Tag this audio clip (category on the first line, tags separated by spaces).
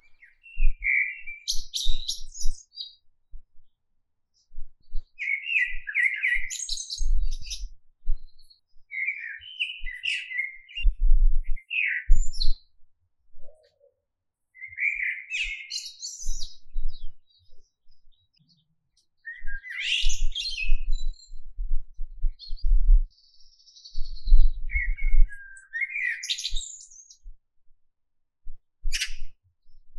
Soundscapes > Nature
bird birds birdsong field-recording forest morning nature spring